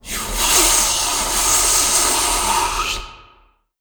Sound effects > Electronic / Design
TOONSwsh-CU Windy Swooshes 01 Nicholas Judy TDC
whoosh, windy, swoosh, wind, cartoon